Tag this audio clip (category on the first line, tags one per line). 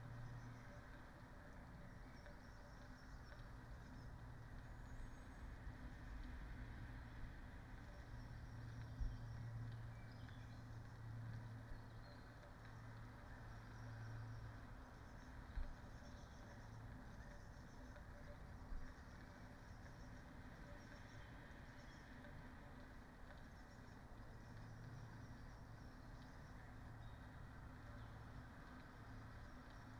Soundscapes > Nature
alice-holt-forest
data-to-sound
Dendrophone
modified-soundscape
natural-soundscape
nature
phenological-recording
raspberry-pi
sound-installation